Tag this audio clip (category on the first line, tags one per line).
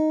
Instrument samples > String
arpeggio
guitar
cheap
tone
sound
stratocaster
design